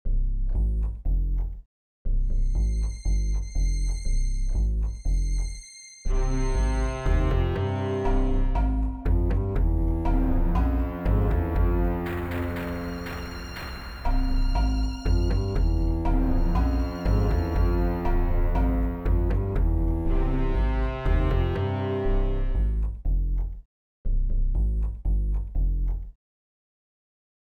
Music > Multiple instruments
A short moody loop, a nice backdrop for some dastardly deeds
cinematic, melancholy, repetition